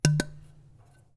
Music > Solo instrument
Marimba Loose Keys Notes Tones and Vibrations 24-001
block, foley, tink, rustle, marimba, oneshotes, wood, notes, perc, loose, percussion, thud, keys, woodblock, fx